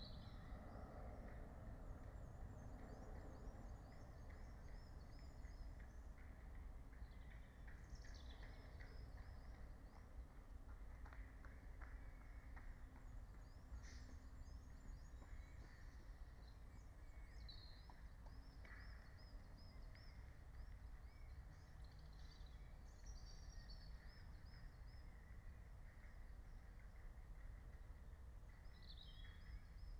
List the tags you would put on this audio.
Soundscapes > Nature
phenological-recording field-recording soundscape natural-soundscape alice-holt-forest meadow nature raspberry-pi

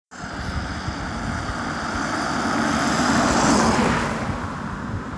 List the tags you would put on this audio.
Sound effects > Vehicles
studded-tires moderate-speed wet-road passing-by car asphalt-road

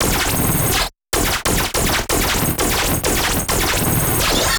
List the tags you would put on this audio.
Sound effects > Electronic / Design
synthetic short fire sci-fi shot gun weapon

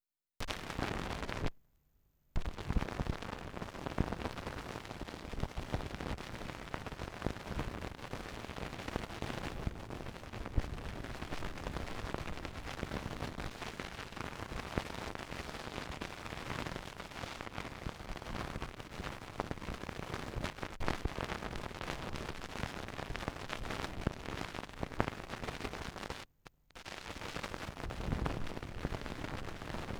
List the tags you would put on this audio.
Sound effects > Objects / House appliances
vinyl turntable surface-noise record

